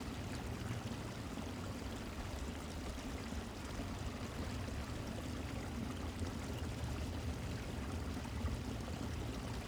Soundscapes > Nature
Small waterfall in a stream recorded with a Rode NTG-3.